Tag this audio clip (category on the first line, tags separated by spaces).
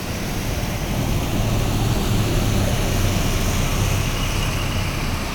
Sound effects > Vehicles
transportation vehicle